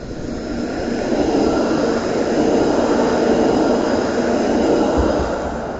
Soundscapes > Urban
A sound of a tram passing by. The sound was recorded from Tampere, next to the tracks on the street. The sound was sampled using a phone, Redmi Note 10 Pro. It has been recorded for a course project about sound classification.